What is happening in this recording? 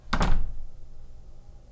Sound effects > Objects / House appliances
Door Close 02
close, closing, door, house, indoor, slam